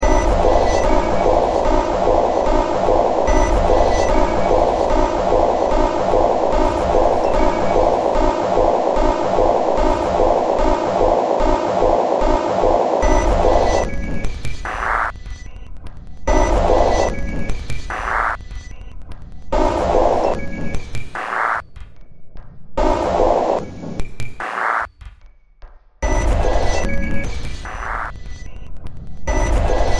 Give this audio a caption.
Music > Multiple instruments
Short Track #2995 (Industraumatic)
Soundtrack, Horror, Ambient, Games, Industrial, Underground, Noise, Cyberpunk, Sci-fi